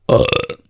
Sound effects > Human sounds and actions
Burp (single, clear)
Recorded while doing VO on a Shure MV7X with a Focusrite Scarlet 2i2 3rd Gen.
clear, single, human, belch, burp, voice, man, male